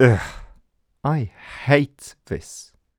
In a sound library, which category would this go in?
Speech > Solo speech